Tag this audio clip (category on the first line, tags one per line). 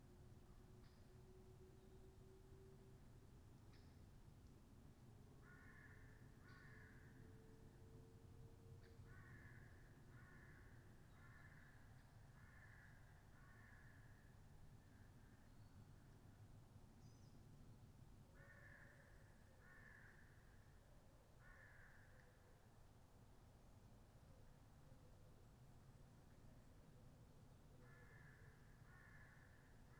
Soundscapes > Nature
weather-data
sound-installation
data-to-sound
field-recording
artistic-intervention
raspberry-pi
soundscape
modified-soundscape
alice-holt-forest
Dendrophone
natural-soundscape
phenological-recording
nature